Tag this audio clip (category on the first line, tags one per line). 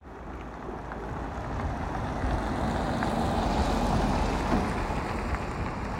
Soundscapes > Urban
bus
transportation
vehicle